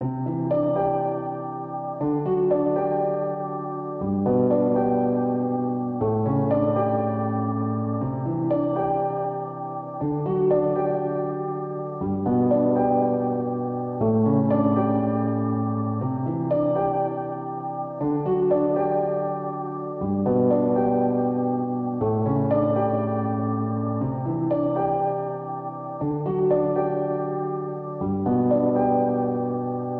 Music > Solo instrument
Piano loops 036 efect 4 octave long loop 120 bpm
120, simplesamples, loop, piano, reverb, pianomusic, free, music, simple, samples, 120bpm